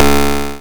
Sound effects > Electronic / Design
some note thing
made in openmpt